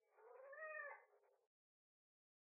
Sound effects > Animals
My cat Bailey meowing.